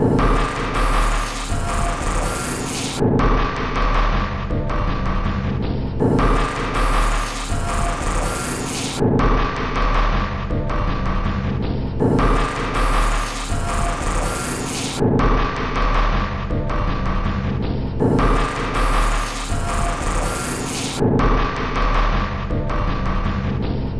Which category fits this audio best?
Instrument samples > Percussion